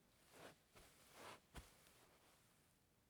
Sound effects > Human sounds and actions
footsteps, carpet, shuffle2
carpet, shuffling, footsteps, foley